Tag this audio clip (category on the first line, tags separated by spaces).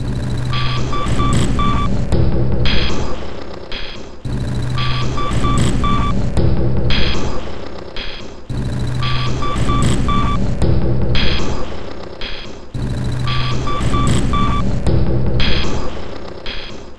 Instrument samples > Percussion
Underground Weird